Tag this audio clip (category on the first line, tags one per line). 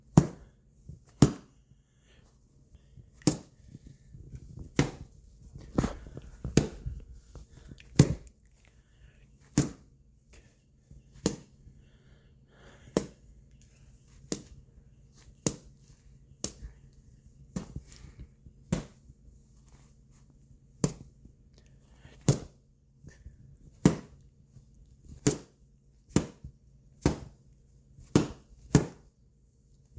Sound effects > Human sounds and actions
fight
glove
hit
foley
Phone-recording
boxing-glove
boxing